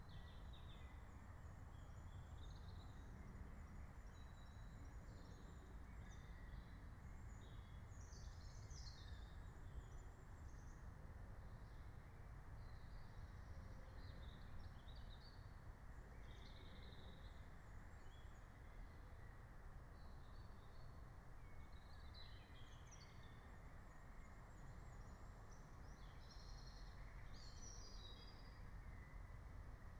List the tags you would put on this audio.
Soundscapes > Nature
meadow soundscape

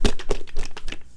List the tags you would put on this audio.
Sound effects > Objects / House appliances
click carton industrial plastic foley clack

